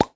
Sound effects > Natural elements and explosions
Recorded on 12.01.2026